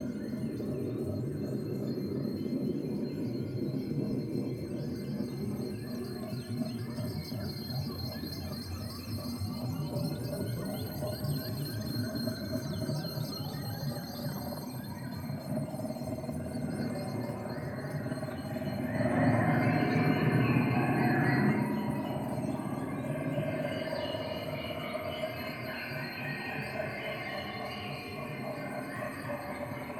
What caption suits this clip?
Soundscapes > Nature
alien planet sphere
Enter a World never Seen or heard before , The alien planet full of life and mystery. A spaceship alien journey are you ready to ride to another planet? captured live from the ASM Hydrasynth through speakers for an organic, immersive vibe
ambience, atmosphere, Field-recorder, sci-fi, synth